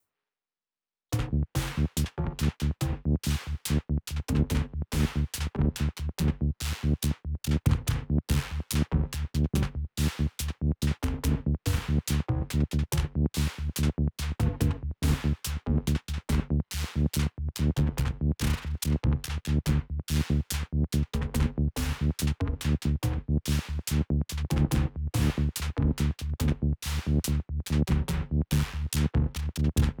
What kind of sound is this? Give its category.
Music > Multiple instruments